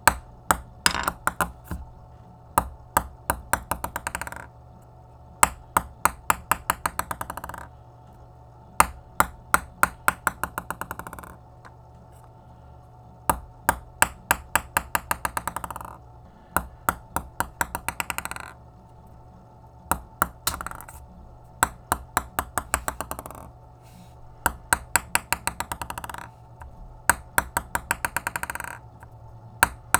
Sound effects > Objects / House appliances
SPRTIndor-Blue Snowball Microphone, CU Ping Pong, Ball, Drops Nicholas Judy TDC
Ping pong ball drops.
drop, foley, ball, Blue-brand, Blue-Snowball, ping-pong